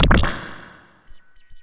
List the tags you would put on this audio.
Instrument samples > Synths / Electronic
1SHOT BENJOLIN CHIRP DIY DRUM ELECTRONICS NOISE SYNTH

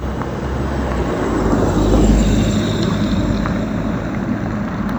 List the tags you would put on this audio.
Sound effects > Vehicles
automobile vehicle

